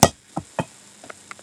Sound effects > Objects / House appliances
this is a ball bounce I made